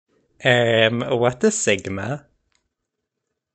Speech > Solo speech
I go "Errm... What the Sigma" (or whatever you may hear it as), referencing a typical brainrot meme expression.